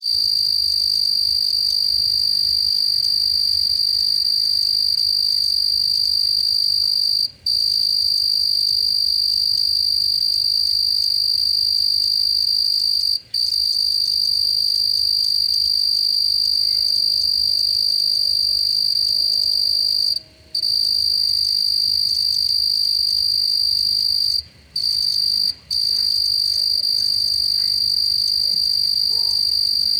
Soundscapes > Nature
Crickets at Night - Loud and isolated from noise
Crickets on the stoop at night and not much extraneous noise. The rattling sound is part of the sound of cricket wings. Zoom F3 with a pair of Clippy omnidirectional mics.
atmosphere, white-noise, soundscape, field-recording, background, background-sound, ambiance, crickets, atmospheric, ambient, country, ambience, noise, atmos, general-noise